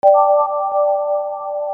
Sound effects > Electronic / Design
Synth Ping UI
Something I made while messing around with additive synthesis, the notes D and G. can maybe be used for UI or something else creative. pretty 2000s sounding. use is free and credit is not required (though it would still be pretty cool)